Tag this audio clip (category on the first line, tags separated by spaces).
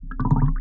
Sound effects > Objects / House appliances
drip pipette